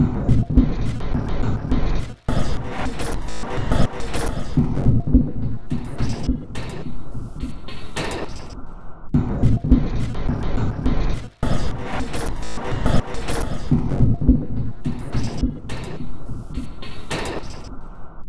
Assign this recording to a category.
Instrument samples > Percussion